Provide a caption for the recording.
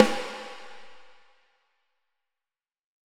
Music > Solo percussion
Snare Processed - Oneshot 32 - 14 by 6.5 inch Brass Ludwig
acoustic, beat, crack, drum, drumkit, drums, flam, fx, hit, hits, kit, ludwig, oneshot, perc, percussion, processed, realdrum, realdrums, rim, rimshot, rimshots, roll, sfx, snare, snaredrum, snareroll, snares